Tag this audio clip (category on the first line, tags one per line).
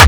Instrument samples > Percussion
brazilianfunk
Crispy
Distorted
Kick
powerful
powerkick
Punch